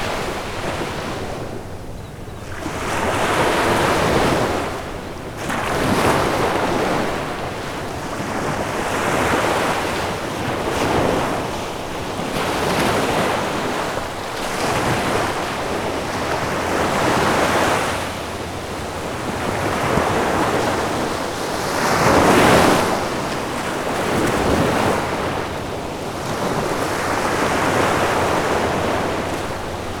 Soundscapes > Urban
WATRSurf-Gulf of Mexico small waves breaking on beach, seagulls, Perdido Pass, morning QCF Gulf Shores Alabama Zoom H1n
Early morning surf at Perdido Pass, Alabama. Summer. waves, wind, nearby traffic, birds